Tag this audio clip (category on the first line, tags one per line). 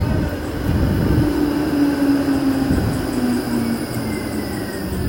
Sound effects > Vehicles
tram
Tampere
vehicle